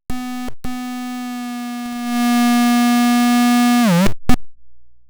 Sound effects > Electronic / Design
Optical Theremin 6 Osc dry-003
Handmadeelectronic, Trippy, Theremins, Infiltrator, DIY, Alien, Theremin, SFX, noisey, Electro, FX, Spacey, Robot, Glitchy, Electronic, Otherworldly, Glitch, Sci-fi, Sweep, Dub, Digital, Noise, Instrument, Synth, Bass, Optical, Experimental, Scifi, Analog, Robotic